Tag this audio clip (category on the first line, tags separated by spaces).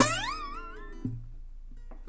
Music > Solo instrument
acosutic guitar instrument riff slap solo string